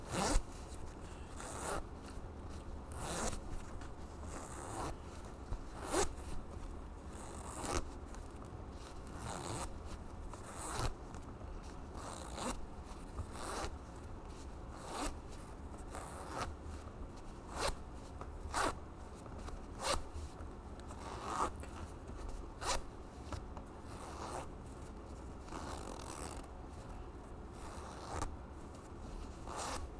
Sound effects > Objects / House appliances
OBJZipr-Blue Snowball Microphone, MCU Jeans Nicholas Judy TDC
Jeans zipper zips.
Blue-Snowball, jeans, zipper